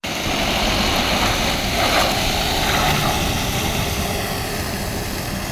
Sound effects > Vehicles
A bus leaving in Tampere, Finland. Recorded with OnePlus Nord 4.